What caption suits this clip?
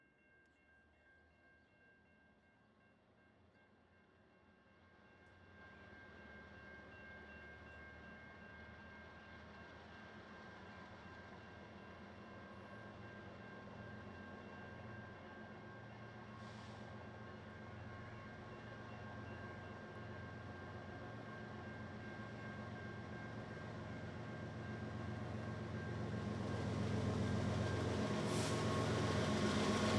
Sound effects > Other mechanisms, engines, machines
BNSF WB 3 Locomotives Intermodal 25 mph STEREO
Stereo train recording at the famous Rochelle Railroad Park, Rochelle, IL. ORTF recording with small diaphragm condensers into Sound Devices Mixpre6. Recorded June 16, 2025.
train, Burlington-Northern, Rochelle-Railroad-park, BNSF, Santa-fe, locomotive